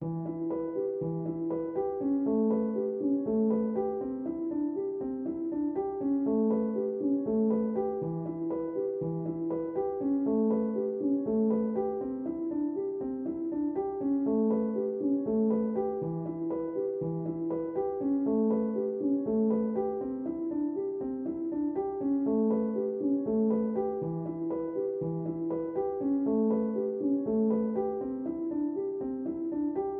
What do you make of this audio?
Music > Solo instrument
Piano loops 190 octave down long loop 120 bpm
120, 120bpm, free, loop, music, piano, pianomusic, reverb, samples, simple, simplesamples